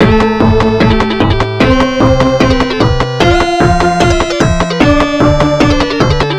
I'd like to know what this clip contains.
Music > Multiple instruments
Short dramatic loop
Loop that i made in furnace. 150bpm, used the SNES preset.
Dramatic, Furnace-tracker, Loop, music